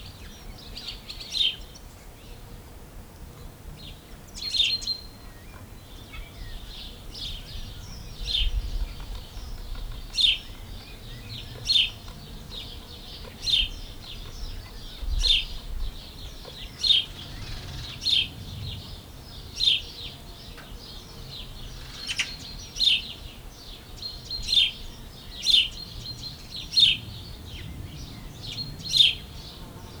Soundscapes > Urban
Subject : Ambience in Gergueil. Date YMD : 2025 04 27 15h40 Location : Gergueil France. Hardware : Zoom H5 stock XY capsule. Weather : Processing : Trimmed and Normalized in Audacity.